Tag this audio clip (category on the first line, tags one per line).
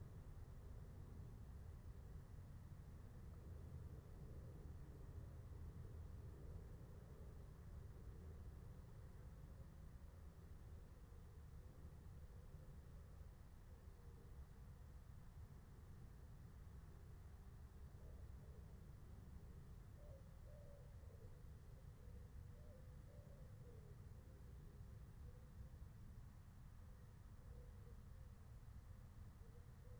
Soundscapes > Nature
natural-soundscape
nature
meadow
raspberry-pi
field-recording
soundscape
phenological-recording
alice-holt-forest